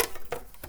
Sound effects > Other mechanisms, engines, machines
metal shop foley -118
bam, bang, boom, bop, crackle, foley, fx, knock, little, metal, oneshot, perc, percussion, pop, rustle, sfx, shop, sound, strike, thud, tink, tools, wood